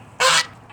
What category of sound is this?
Sound effects > Animals